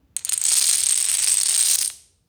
Sound effects > Objects / House appliances
GAMEBoard chips drop hit constant fast chain ceramic straight
The dominoes fall rapidly in a chain, tilting and hitting the ceramic floor. Their falling speed remains the same.
chain, constant, drop